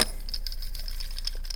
Sound effects > Objects / House appliances
cap foley
jostling caps around recorded with tasam field recorder
cap, delicate, foley, metal, sfx, small, tap, taps, tink